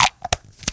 Sound effects > Objects / House appliances
A variety of pill bottle sound effects. Simple as that lol!